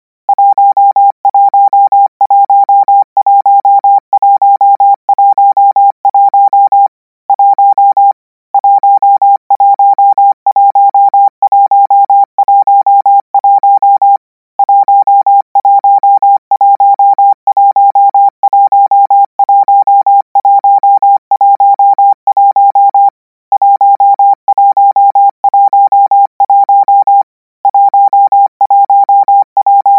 Sound effects > Electronic / Design
Koch 36 1 - 200 N 25WPM 800Hz 90%
Practice hear number '1' use Koch method (practice each letter, symbol, letter separate than combine), 200 word random length, 25 word/minute, 800 Hz, 90% volume.
code, codigo, morse, numbers, numeros, radio